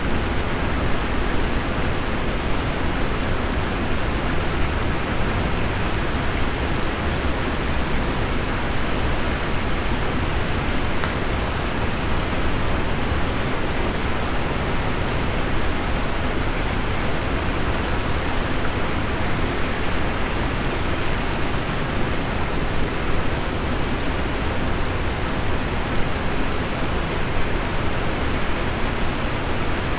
Soundscapes > Urban
250811 05h04 Albi Passerelle du pont (behind an arch) - NT5-o
Subject : Date YMD : 2025 August 11 Early morning : Location : Albi 81000 Tarn Occitanie France. NT5 with a omni capsule (NT5-o). Weather : 24°c ish 60% humidity clear sky, little to no wind (said 10km/h, most locations I was was shielded) Processing : Trimmed and normalised in Audacity.
2025, 81000, Albi, August, bridge, City, Early-morning, France, FR-AV2, Mono, Night, NT5-o, NT5o, Occitanie, Omni, Rode, Single-mic-mono, Tarn, Tascam, water